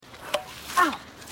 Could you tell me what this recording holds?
Sound effects > Human sounds and actions
Bonk Ow
a water bottle hitting my cousin's knee
bonk, ow, sfx, hurt